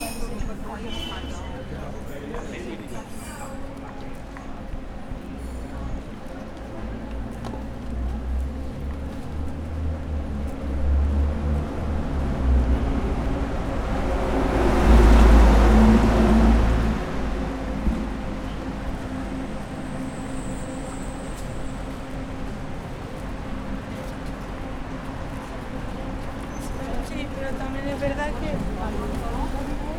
Soundscapes > Urban
City center street - Turin
Evening recording of a street in the center of Turin, with vehicles and people passing in the background. Recorded with a Zoom h1essential.